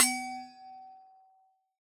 Sound effects > Objects / House appliances
Resonant coffee thermos-011
sampling, recording